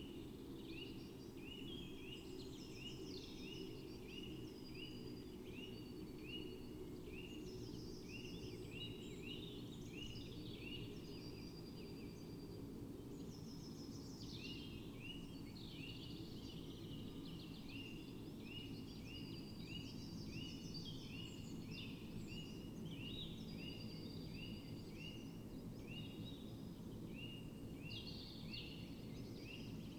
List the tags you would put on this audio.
Soundscapes > Nature
artistic-intervention
modified-soundscape
nature
weather-data

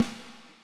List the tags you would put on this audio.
Music > Solo percussion
snare
oneshot
acoustic
reverb
rimshot
snares
drums
drum
sfx
hits
roll
kit
snareroll
perc
hit
drumkit
brass
beat
crack
percussion
realdrums
rim
ludwig
rimshots
fx
realdrum
snaredrum
processed
flam

